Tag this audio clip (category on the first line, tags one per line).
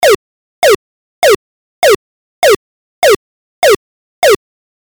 Sound effects > Electronic / Design
Arcade
Music
Slap
Electro
Bass
Drum
Dance
Loop
Drums
House
Clap
Kick
Snare
EDM
Free